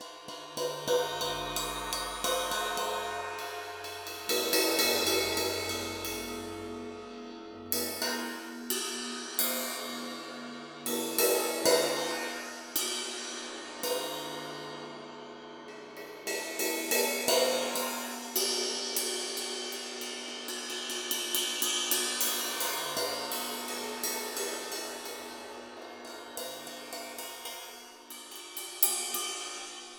Music > Solo instrument
Cymbal Combination Multi-004
Crash, Cymbal, Cymbals, Drum, Drumkit, Drums, Hat, kit, Metal, Metallic, Perc, Percussion, Ride